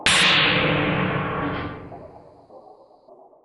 Sound effects > Electronic / Design
Impact Percs with Bass and fx-016
bash, bass, brooding, cinamatic, combination, crunch, deep, explode, explosion, foreboding, fx, hit, impact, looming, low, mulit, ominous, oneshot, perc, percussion, sfx, smash, theatrical